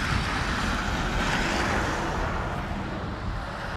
Sound effects > Vehicles
Bus that is leaving away from the microphone.
bus, transportation, vehicles